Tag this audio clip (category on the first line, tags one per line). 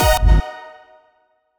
Instrument samples > Percussion
hardstyle
rawstyle